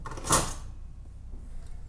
Sound effects > Objects / House appliances
knife and metal beam vibrations clicks dings and sfx-096
Clang, ding, Foley, FX, Klang, Metal, metallic, Perc, Vibrate, Vibration, Wobble